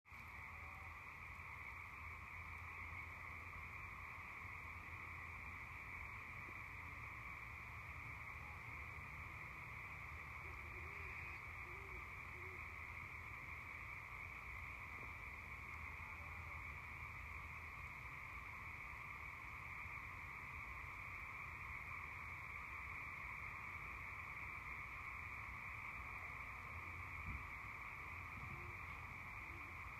Soundscapes > Nature
Evening winter Sunday 01/14/2024
Evening sheep sound farm
rural, sunday, winter, sheep, farm, evening, frogs